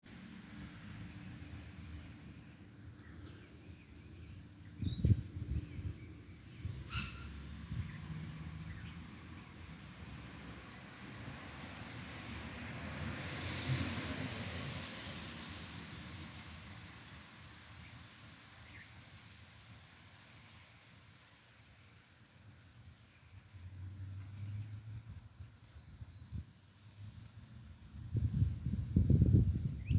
Soundscapes > Urban
sitting by the Virgin Mary statue at Our Lady Queen of Peace in Pitman, New Jersey 2025 05 13-12 49 49
Recorded while sitting by the Virgin Mary statue at Our Lady Queen of Peace in Pitman, New Jersey.
field-recording, outside, suburban